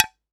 Sound effects > Objects / House appliances
Slate hit Stereo
Subject : Two slate rocks hitting each other. Date YMD : 2025 04 20 Location : Gergueil France. Hardware : Zoom H2n MS mode. Weather : Processing : Trimmed and Normalized in Audacity. Maybe some fade in/out.